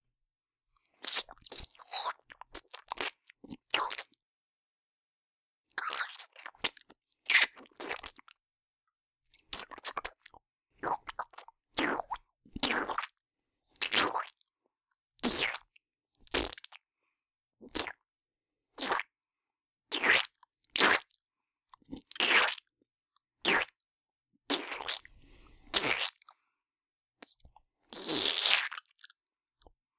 Sound effects > Natural elements and explosions
Squishes for Mud, Fruit, and Blood
Squishing noises made by my own horrible mouth. Useful for mud, fruit, goo, ooze, and all manner of disgusting substances.
swamp, muck, wet, gore, squirting, squishy, disgusting, blood, goo, squirt, ooze, squirming, squirm, vile, squishing, squish, gross, yuck, fruit, yucky, mud